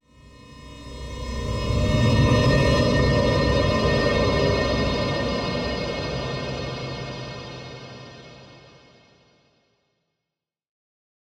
Sound effects > Electronic / Design
A simple, reverse magic SFX designed in Reaper with various plugins.